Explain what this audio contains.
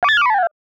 Sound effects > Other mechanisms, engines, machines
A very cute sounding small robot talk, bleeping. I originally designed this for some project that has now been canceled. Designed using Vital synth and Reaper